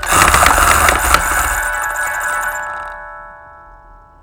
Sound effects > Objects / House appliances
TOONMisc-Blue Snowball Microphone, CU Jewelry Box, Musical, Going Crazy Nicholas Judy TDC
A musical jewelry box goes crazy.
musical, crazy, Blue-brand, jewelry-box, Blue-Snowball, spin